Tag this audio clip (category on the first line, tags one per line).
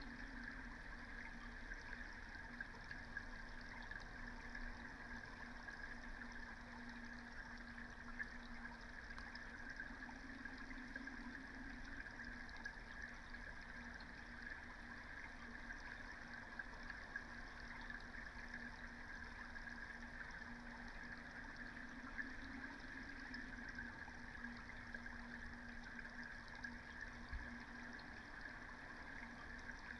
Soundscapes > Nature
sound-installation
soundscape
field-recording